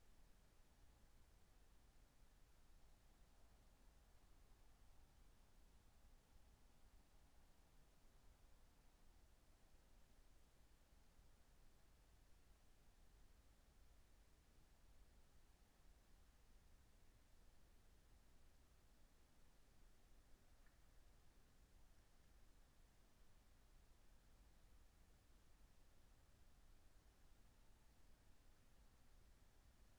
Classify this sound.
Soundscapes > Nature